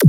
Instrument samples > Percussion
Glitch-Liquid Kick 3
Sample used from FLstudio original sample pack. Plugin used: Vocodex.
Glitch, Kick, Liquid, Organic